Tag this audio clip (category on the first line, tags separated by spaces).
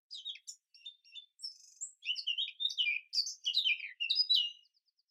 Sound effects > Animals
Bird birds blackcap chirp field-recording morning nature songbird